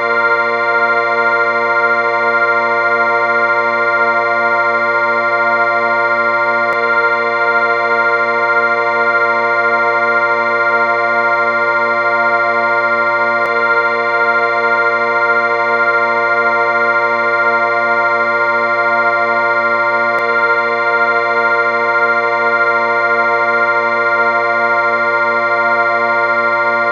Music > Solo instrument
MUSCInst-CU Bagpipe, Single Blown Note, Looped Nicholas Judy TDC
A single blown bagpipe note. Looped. Created using a Casio keyboard.
bagpipe, blown, casio, loop, note, single